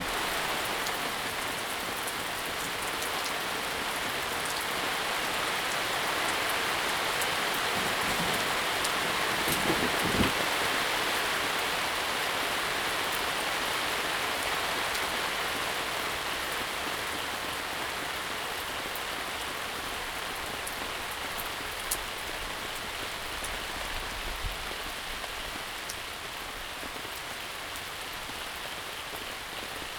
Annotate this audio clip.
Soundscapes > Nature
Rain at Lake at Night - Easing Off
Lake, Easing, Off, Rain, Night